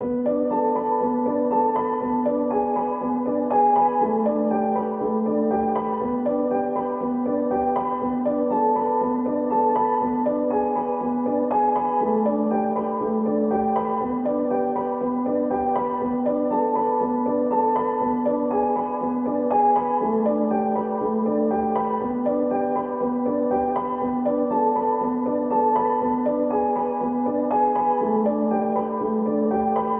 Music > Solo instrument
Piano loops 088 efect 4 octave long loop 120 bpm
120, 120bpm, free, loop, music, piano, pianomusic, reverb, samples, simple, simplesamples